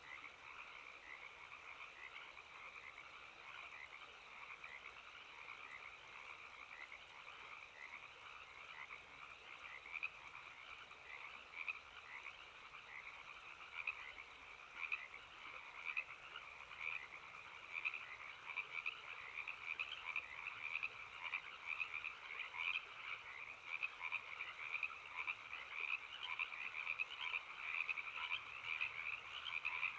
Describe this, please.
Soundscapes > Nature
Frog Creek Ambience
Ambient sound of a creek at night in Ojai, CA. Frogs in foreground, crickets in background. Recorded with a shotgun mic + stereo field recorder to create a wide image.
night, ambient